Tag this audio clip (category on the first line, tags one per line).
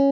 Instrument samples > String
arpeggio
tone
guitar